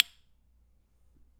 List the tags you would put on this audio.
Sound effects > Objects / House appliances
industrial
oneshot
metal
foley
fx
percussion
clunk
perc
object

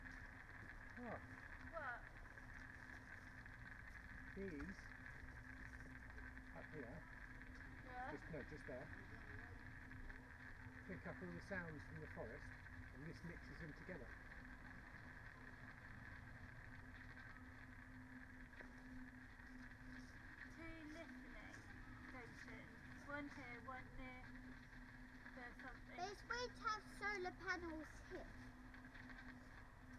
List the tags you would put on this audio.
Soundscapes > Nature
sound-installation
raspberry-pi
natural-soundscape
Dendrophone
soundscape
field-recording
weather-data
alice-holt-forest
phenological-recording
artistic-intervention
modified-soundscape
data-to-sound
nature

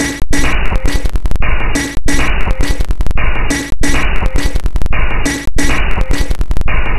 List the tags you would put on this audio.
Instrument samples > Percussion
Dark
Ambient
Drum
Loop
Weird
Alien
Packs
Samples
Industrial
Underground
Soundtrack
Loopable

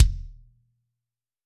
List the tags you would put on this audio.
Instrument samples > Percussion
drumkit
drums
kick
kickdrum
sample
trigger

